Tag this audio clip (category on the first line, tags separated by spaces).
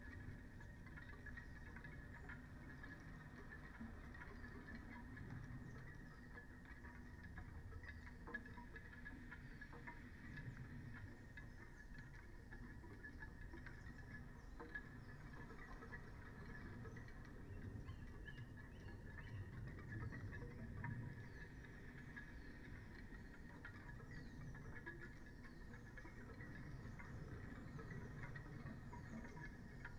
Soundscapes > Nature
data-to-sound natural-soundscape nature artistic-intervention sound-installation soundscape field-recording alice-holt-forest raspberry-pi weather-data Dendrophone phenological-recording modified-soundscape